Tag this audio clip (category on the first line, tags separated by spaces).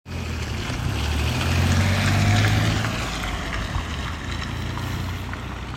Sound effects > Vehicles
outside vehicle car